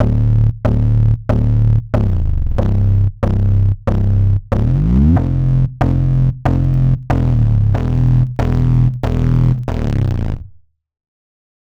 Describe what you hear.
Music > Solo instrument
93bpm - PsyTechBass12 Dminor - Master
2 of 3 Variant 1 of PsyTechBass.